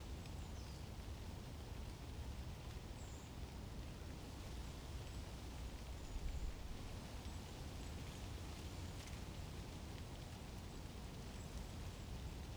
Soundscapes > Nature
Wind in the trees with birds chirping in the distance. Recorded with a Rode NTG-3.